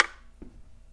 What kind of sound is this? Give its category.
Sound effects > Objects / House appliances